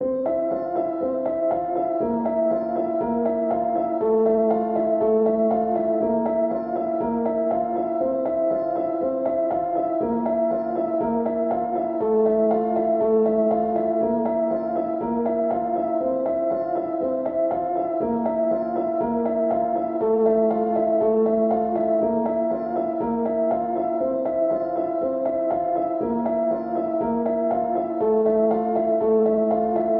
Music > Solo instrument
Piano loops 076 efect 4 octave long loop 120 bpm
120, simplesamples